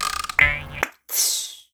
Sound effects > Electronic / Design
Anime take off. Comical.